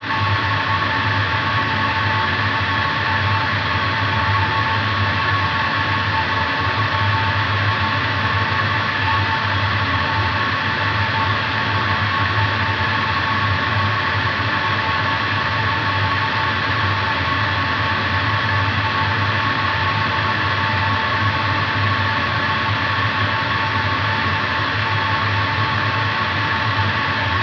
Soundscapes > Urban
IDM Atmosphare2
Synthed with PhasePlant Granular
Ambient, Atomsphare, IDM, Industry, Noise